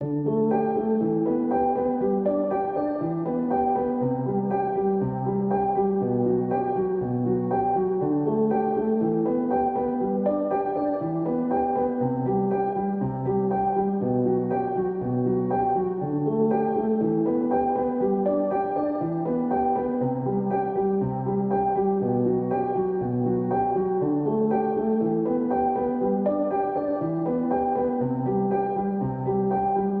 Music > Solo instrument
Piano loops 184 efect 4 octave long loop 120 bpm
free, music, loop, piano, simplesamples, pianomusic, samples, 120bpm, 120, simple, reverb